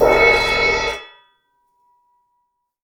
Music > Solo instrument
Sabian 15 inch Custom Crash-10
Perc, Cymbals, Oneshot, Metal, Cymbal, Crash, Percussion, Sabian, Custom, Drum, 15inch, Drums, Kit